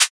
Instrument samples > Synths / Electronic
A shaker one-shot made in Surge XT, using FM synthesis.
fm, electronic, synthetic, surge